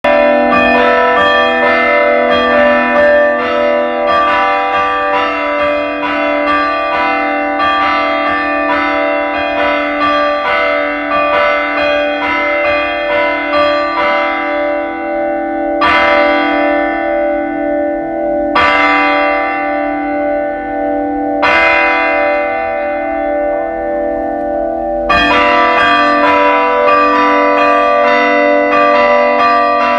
Instrument samples > Percussion
Mission San Juan Batista Bells
Bell, Mission Bell, Sacred music
Bell, Mission, Percussion, Sacred